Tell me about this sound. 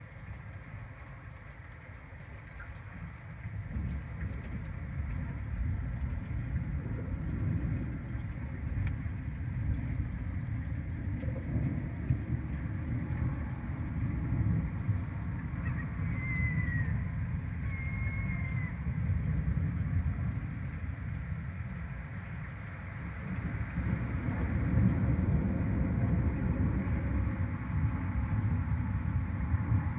Soundscapes > Nature
An Intensifying Storm with Thunder, Rain and Birds (Somerset, England)
An intensifying storm recorded in Somerset, England on the morning of September 7th, 2025 using a Google Pixel 9a phone. I want to share them with you here.
birds, field-recording, lightning, nature, rain, storm, thunder, thunderstorm, weather